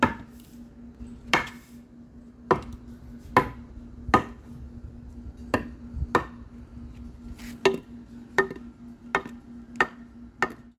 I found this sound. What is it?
Sound effects > Objects / House appliances
WOODImpt-Samsung Galaxy Smartphone, CU Board Hits Nicholas Judy TDC
Wooden board hits.